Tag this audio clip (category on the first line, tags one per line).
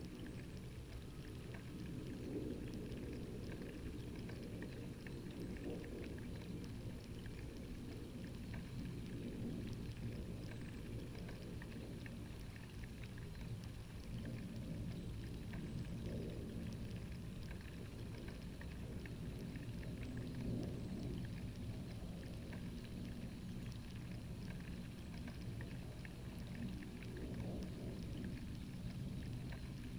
Soundscapes > Nature
phenological-recording
soundscape
sound-installation
alice-holt-forest
weather-data
artistic-intervention
raspberry-pi
data-to-sound
modified-soundscape
field-recording
nature
Dendrophone
natural-soundscape